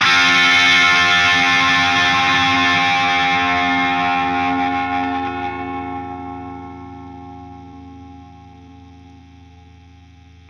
Instrument samples > String
Real Guitar
"High-gain electric guitar lead tone from Amplitube 5, featuring a British Lead S100 (Marshall JCM800-style) amp. Includes noise gate, overdrive, modulation, delay, and reverb for sustained, aggressive sound. Perfect for heavy rock and metal solos. Clean output from Amplitube."